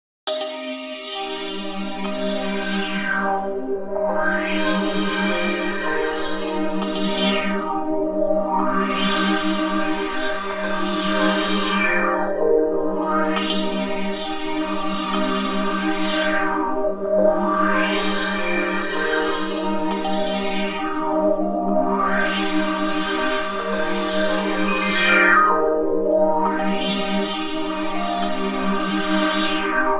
Music > Multiple instruments
Melody loop 3 bar 2 variations
110bpm Made in koala sampler with delay, phase, filtering and other micro tweakings.
Oscillation, Pulsating, Repeating, Strange